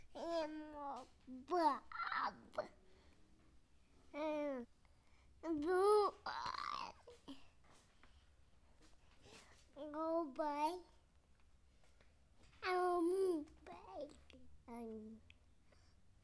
Sound effects > Human sounds and actions
Child 1 Year Liam Happy Front Humdrum
Recording of my son at 1 year old, nice chuckles
Year, Child, Happy, 1